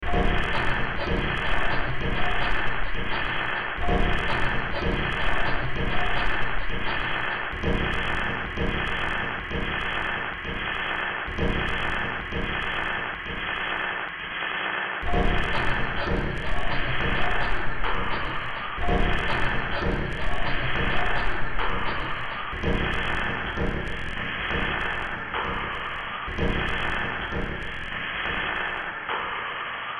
Music > Multiple instruments
Demo Track #3701 (Industraumatic)
Industrial, Underground, Sci-fi, Noise, Soundtrack, Horror, Ambient, Cyberpunk, Games